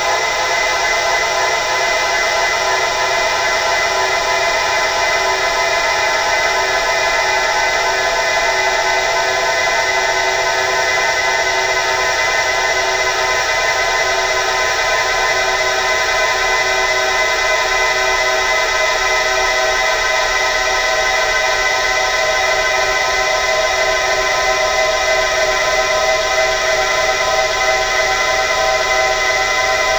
Sound effects > Experimental
"The moment came upon me so suddenly, I was overwhelmed, frozen in fear." For this sound effect I used a Zoom H4n multitrack recorder to sample ambient noises in my kitchen. I then layered and tinkered with a number of those sounds (using Audacity) to produce the final piece you hear in this upload.
wail, overwhelming, piercing, panic, cry, zoom-h4n, alarm, urgent, sudden, audacity